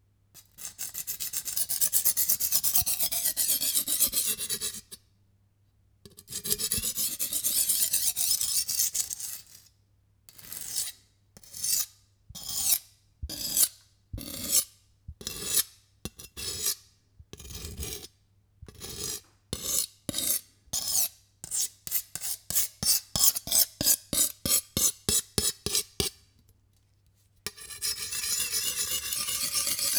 Sound effects > Objects / House appliances
Subject : Sharpening a knife with a old laguiole sharpening stone (somewhat "knife blade" shaped itself.) Date YMD : 2025 July 20 Location : In a kitchen. Sennheiser MKE600 P48, no filter. Weather : Processing : Trimmed in Audacity. Notes : Recorded for Dare2025-09 Metal Friction series of dares.